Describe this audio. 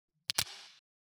Sound effects > Natural elements and explosions
Twigs Snap Reverbed
Snapped Twig Sound Effect: I recorded a snapping of a twig and then edited it in Audition.
twig, reverbed